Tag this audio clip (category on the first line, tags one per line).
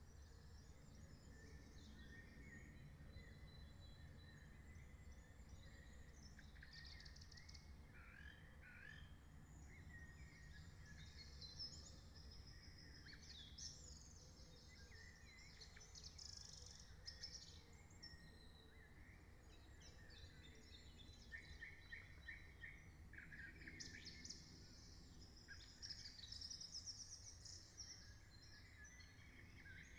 Soundscapes > Nature
data-to-sound
alice-holt-forest
modified-soundscape
Dendrophone
sound-installation
raspberry-pi
field-recording
weather-data
artistic-intervention
natural-soundscape
nature
phenological-recording
soundscape